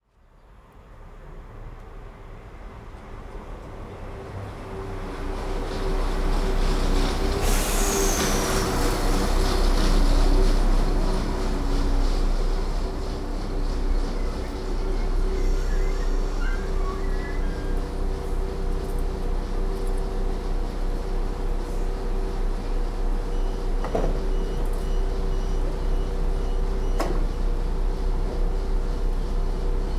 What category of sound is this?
Soundscapes > Urban